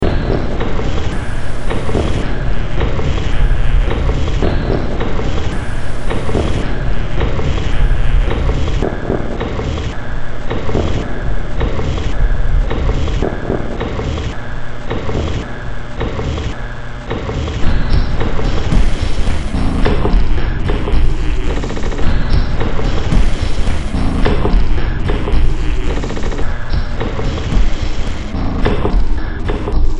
Music > Multiple instruments
Demo Track #3026 (Industraumatic)
Track taken from the Industraumatic Project.
Cyberpunk, Underground, Ambient, Sci-fi, Games, Horror, Industrial, Soundtrack, Noise